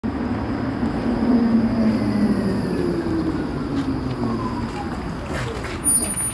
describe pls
Sound effects > Vehicles
11tram tostopkaleva
A tram is slowing down to a full stop in Kaleva, Tampere. At the very end the breaks are squeaking very loudly. Recorded on a Samsung phone.